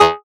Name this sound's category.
Instrument samples > Synths / Electronic